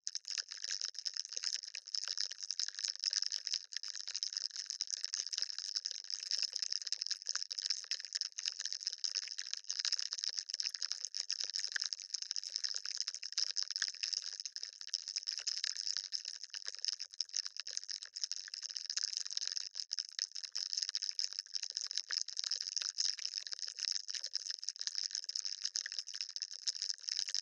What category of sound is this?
Sound effects > Electronic / Design